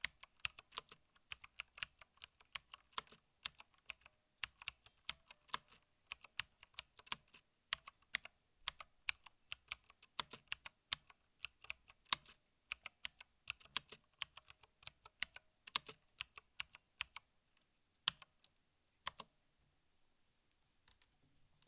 Sound effects > Other mechanisms, engines, machines
Very slow typing on a mechanical keyboard. Recorded using a Pyle PDMIC-78
computer, key, keyboard